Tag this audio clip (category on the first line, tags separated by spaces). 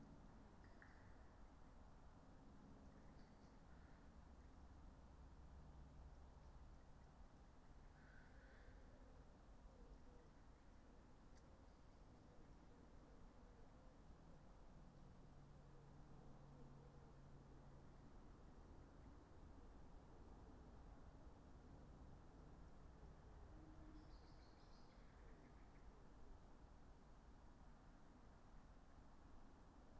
Soundscapes > Nature
data-to-sound Dendrophone phenological-recording weather-data modified-soundscape natural-soundscape sound-installation